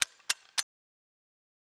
Sound effects > Other mechanisms, engines, machines
Ratchet strap-5
machine, machinery, mechanical, ratchet, crank, clicking, strap